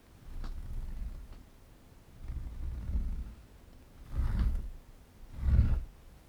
Sound effects > Objects / House appliances
Fingernails on bedside matt 2x slow 2x fast
2x slow scrapes and 2x fast scrapes. Recorded with a Zoom H1. The sound was very faint so I had to boost the volume and use noise reduction in Audacity.
Dare2025-08, fingernails, friction, matt, scrape, scrapping, soft